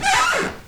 Sound effects > Objects / House appliances
Creaking Floorboards 08
scrape, hardwood, walk, squeaking, room, footsteps, squeaky, wood, going, squeak, wooden, squeal, walking, creaking, creaky, screech, grind, floor, old, old-building, grate, rub, weight, floorboards, footstep, heavy, flooring, floorboard, bare-foot, groan